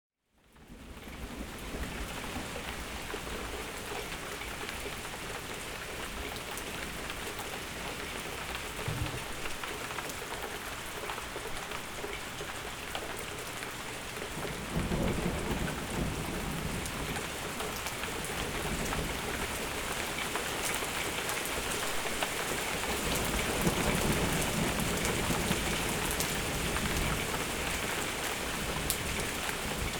Soundscapes > Nature
Rain-Thunder June 6, 2025
Another from my front porch. Zoom H4n.
thunder, field-recording, Storm